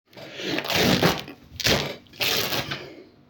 Sound effects > Objects / House appliances
Tearing a T-shirt on the chest
Tearing a shirt on the chest, suitable for movies and theatre plays, video games, performing arts,.
clothes, cotton, fabrics, hysterical, linen, man, scandal, shirt, sighs, tearing, zipping